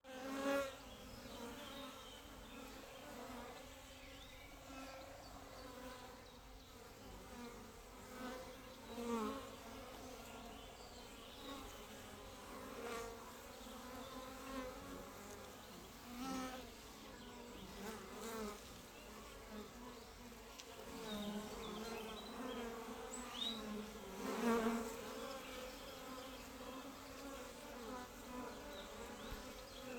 Soundscapes > Nature
ANMLInsc Bees Close GILLE HOFEFELD Oktava MK4012 BFormat 5.23 16-48-24
ambience, 3D, spatial, ambisonics